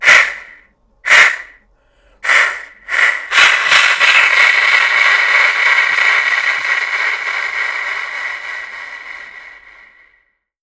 Sound effects > Vehicles
A cartoon steam train pulling away. Simulated using an Acme Windmaster.